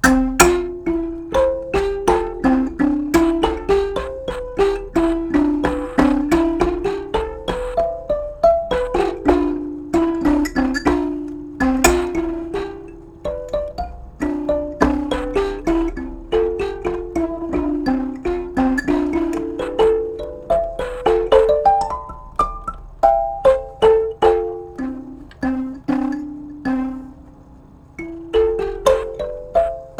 Music > Solo percussion
A kalimba song.